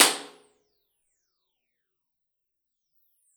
Sound effects > Other
Stairwell impulse response
Impulse response of the stairwell leading to the upstairs in my house. Somewhat ringy, metallic.